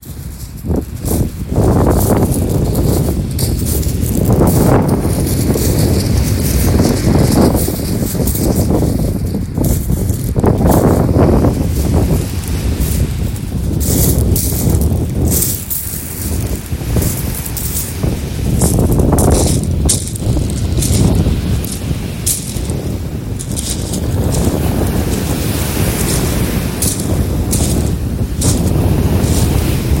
Soundscapes > Nature
windy brighton beach
windy windy windy